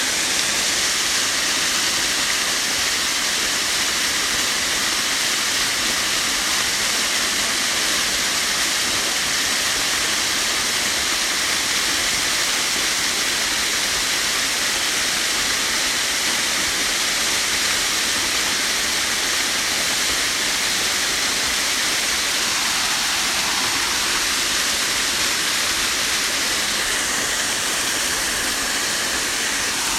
Soundscapes > Nature
Field recording of cascading water at Erawan Waterfall in Thailand. Strong water flow with natural forest ambiance.